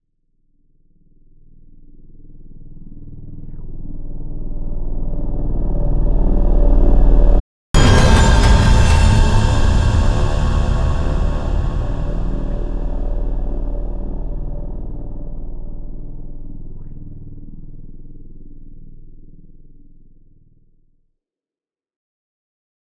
Sound effects > Other
Dark Trailer Impact Sound Effect 002
thriller, creepy, tension, suspense, atmosphere, effect, horror, sfx, dark, sound, trailer, scary, impact, fear, cinematic, ambient, movie, jumpscare